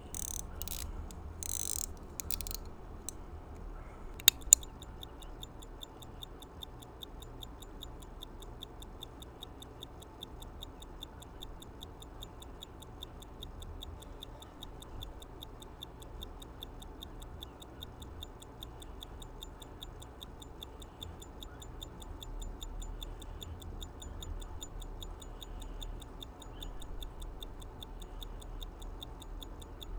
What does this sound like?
Sound effects > Objects / House appliances
CLOCKMech-Blue Snowball Microphone Stopwatch, Wind, Start, Tick, Stop Nicholas Judy TDC
A stopwatch winding, starting, ticking and stopping.
start, wind, Blue-Snowball, Blue-brand, stopwatch, tick, stop